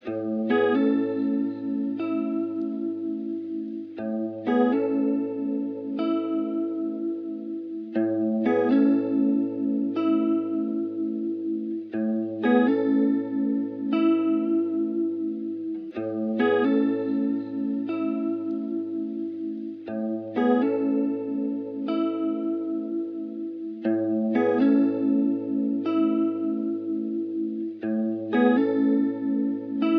Music > Solo instrument
Guitar loops 126 03 verison 03 60.4 bpm
Guitar loop played on a cheap guitar. This sound can be combined with other sounds in the pack. Otherwise, it is well usable up to 4/4 60.4 bpm.
bpm, electric, electricguitar, free, guitar, loop, music, reverb, samples, simple, simplesamples